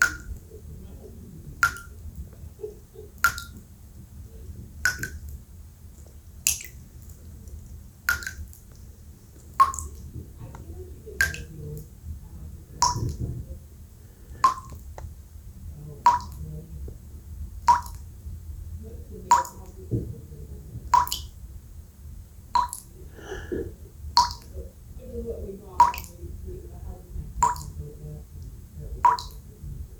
Sound effects > Natural elements and explosions
WATRDrip Bathtub Dripping Nicholas Judy TDC
A bathtub dripping.